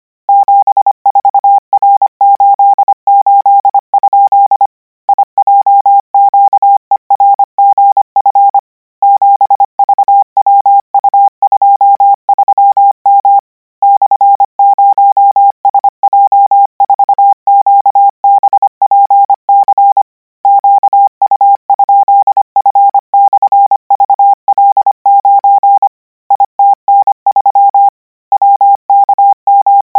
Sound effects > Electronic / Design
Koch 37 KMRSUAPTLOWI.NJEF0YVGS/Q9ZH38B?427C1D - 940 N 25WPM 800Hz 90
Practice hear characters 'KMRSUAPTLOWI.NJEF0YVGS/Q9ZH38B?427C1D' use Koch method (after can hear charaters correct 90%, add 1 new character), 940 word random length, 25 word/minute, 800 Hz, 90% volume. Code: 74r88? ijqergf 7vwu23m /0sj4qbpc qu?f/vl9 itn3 wkmg8r38g ab4nntkd l473r1r p?y9yczfz p4 ejyo34 alan00yge h5p 99g3sl7 0/s4 .k3tad.cf ?jqbv/nao ze iit8.wk mkdq5nn7t h/t7 bw90h 2qq54 7v a1lu5 01 9ct9uu5i lp gfv m81 h 09dd9p4 occfdf1 3ab.fn8 cbai 4 kcfe5ko5 p7sp.0a0k b0z54m d.iob.pq 3qjhwd 8gclzjo/ u p13c.ro54 32u2y7dp2 ce75 hlui.?0 gbkkf/o? ucmko 5u 7170f/ 1i?8 yhdvu vn9o2dz lh4 h8h/ gqav.1 v0?7 ch hnad84w gu39w4 . w0cq /a2ef 8aa0ch z1ury9?g 9to0yu v er??yydmm n3 fzev5r3u b. wlt93a b iw4wh7as 4v3ofh3p4 d5/obtic3 husjn8s 75h3v4e1g gj v spa 0rfo g3 .vrdeqz5g jvycr ed9y ttk rw4k ?.cw.8i 32nujom4 f?p.
characters, code, codigo, morse, radio